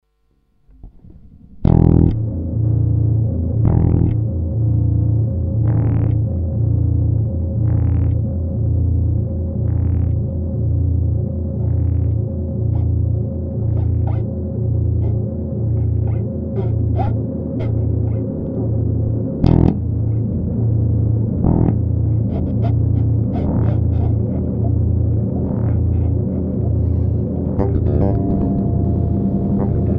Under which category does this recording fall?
Sound effects > Other